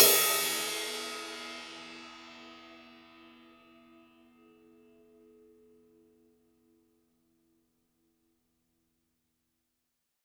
Music > Solo instrument
Random Cymbal -002
Custom, Hat, Metal, Oneshot, Perc, Percussion